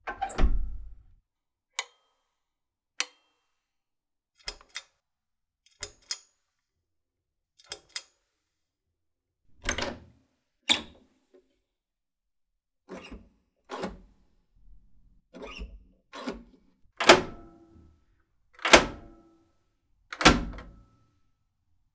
Sound effects > Other mechanisms, engines, machines
Brass Switches and Levers Steampunk/Dieslpunk
A sequel to my original brass switches pack. -It contains an antique knife switch (The old "mad scientist power switch"). -A brass knob and push switch, both from 1920s brass light fixtures. -Several victorian era brass and iron lever style door handles. -A contemporary brass and steel door mechanism from a hotel.
switch, sound-effect, steampunk, mechanical, diselpunk, lever